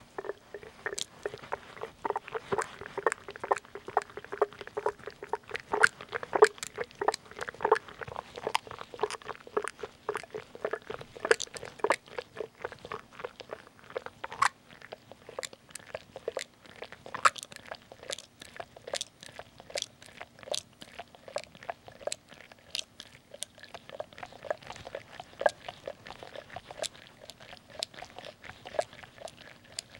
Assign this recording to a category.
Sound effects > Human sounds and actions